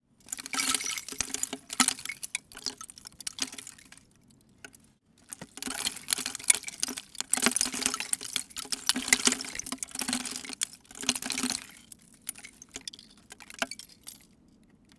Soundscapes > Nature
Recorded that sound by myself with Recorder - H1 Essential